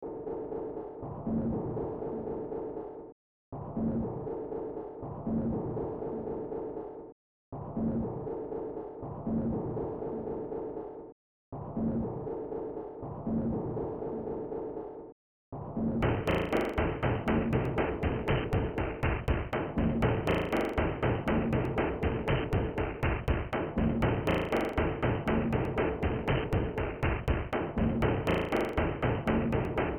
Music > Multiple instruments
Demo Track #3969 (Industraumatic)
Games, Underground, Sci-fi, Horror, Cyberpunk, Noise, Soundtrack, Industrial, Ambient